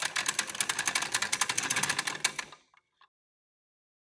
Sound effects > Other mechanisms, engines, machines
Pull Chain-10
chain, gears, loadingdoor, machinery, mechanical